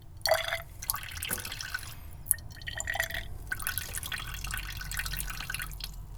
Sound effects > Objects / House appliances
glass beaker small filling with water foley-003
bonk, clunk, drill, fieldrecording, foley, foundobject, fx, glass, hit, industrial, mechanical, metal, natural, object, oneshot, perc, percussion, sfx, stab